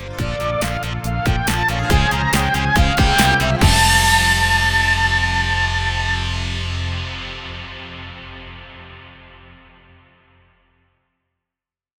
Music > Multiple instruments
Outro Jingle (Happy-Go-Lucky) 2
Don't think it's particularly good compared with my more recent current stuff, but perhaps someone will find good use of it. The timbre and speed of this version are a bit different than with the first one.
outro-jingle-theme, movie-outro, jingle, lighthearted-outro, upbeat-outro-jingle, happy-outro, outro-jingle, channel-outro-theme, movie-outro-jingle, kawaii, outro-theme, happy-outro-jingle, lighthearted-outro-jingle, intro-jingle, end-credits-jingle, upbeat-outro, advertising-jingle, end-credits-theme